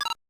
Sound effects > Electronic / Design
Glitch (Faulty Core) 8
audio-glitch, audio-glitch-sound, audio-glitch-sound-effect, computer-error, computer-error-sound, computer-glitch, computer-glitch-sound, computer-glitch-sound-effect, error-fx, error-sound-effect, glitches-in-me-britches, machine-glitch-sound, ui-glitch, ui-glitch-sound, ui-glitch-sound-effect